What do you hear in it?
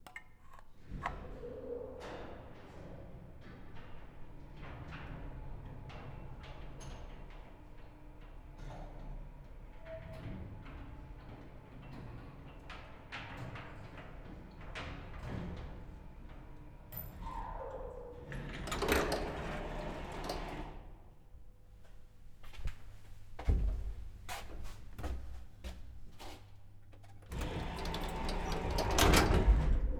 Sound effects > Other mechanisms, engines, machines
Old Soviet Blockhouse Elevator
Taking an elevator ride in an old soviet building.